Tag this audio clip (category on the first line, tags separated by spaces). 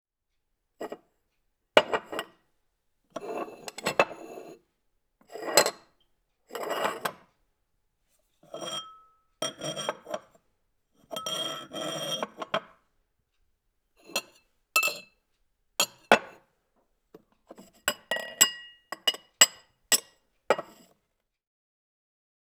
Sound effects > Objects / House appliances
clink sfx handle clinking kitchen glass